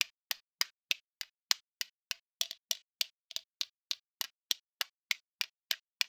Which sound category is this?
Instrument samples > Percussion